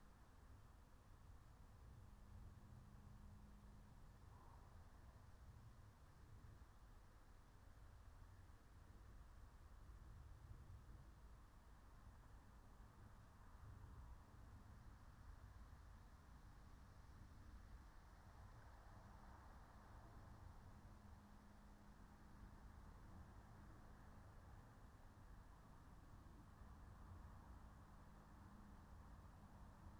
Soundscapes > Nature
Automatic recording from a wood near Alice Holt Lodge Pond, Surrey, UK. Recorded with a DIY Raspberry Pi audio streamer designed by Luigi Marino. Before Feb 28th 2025, the recordings were done using MEMs microphones. Since Feb 28th 2025, the quality of the recordings has improved considerably because of changes in the equipment, including switching to Rode LavalierGO mics with Rode AI-Micro audio interface and software updates. This solar-powered system is typically stable, but it may go offline due to extreme weather factors. This recording is part of a natural soundscape dataset captured four times a day according to solar time (sunrise, solar noon, sunset, and at the midpoint between sunset and sunrise). The main tree species is Corsican pine, planted as a crop in 1992, and there are also mixed broadleaf species such as oak, sweet chestnut, birch, and willow. The animal species include roe deer, muntjac deer, and various birds, including birds of prey like buzzard and tawny owl.
field-recording, alice-holt-forest, phenological-recording, natural-soundscape, nature, meadow, soundscape, raspberry-pi